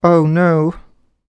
Speech > Solo speech
Sadness - Ohh noo
dialogue, FR-AV2, Human, Male, Man, Mid-20s, Neumann, no, NPC, oneshot, sad, Sadness, singletake, Single-take, talk, Tascam, U67, Video-game, Vocal, voice, Voice-acting, word